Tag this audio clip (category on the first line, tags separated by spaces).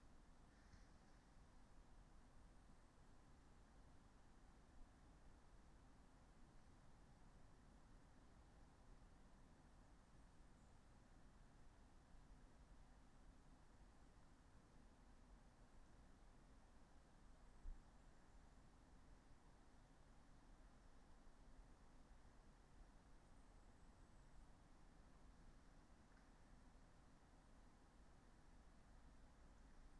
Soundscapes > Nature
data-to-sound artistic-intervention